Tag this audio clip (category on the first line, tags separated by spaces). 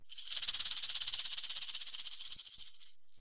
Instrument samples > Percussion
recording sampling